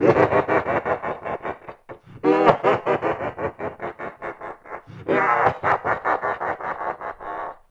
Sound effects > Human sounds and actions
Evil Laugh
My laughter with a bit of editing to make it sound more menacing.
evil, laugh, laughing, laughter, villain